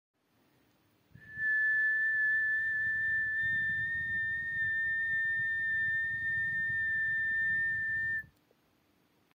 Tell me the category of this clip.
Sound effects > Human sounds and actions